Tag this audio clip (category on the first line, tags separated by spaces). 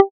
Instrument samples > Synths / Electronic
additive-synthesis fm-synthesis pluck